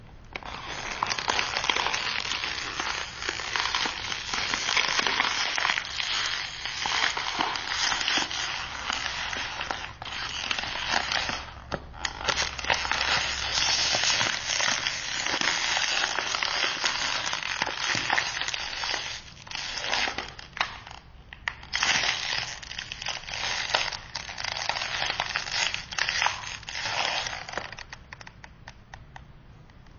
Sound effects > Natural elements and explosions
Bones breaking or flesh crunches. Also used for ice crackling.